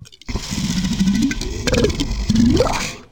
Sound effects > Experimental
Creature Monster Alien Vocal FX (part 2)-056
Alien, bite, Creature, demon, devil, dripping, fx, gross, grotesque, growl, howl, Monster, mouth, otherworldly, Sfx, snarl, weird, zombie